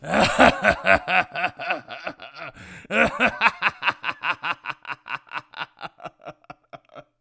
Sound effects > Human sounds and actions
evil male laugh
Recorded with Rode NT1-A Pirate male laugh maybe drunk?
laugh, male, evil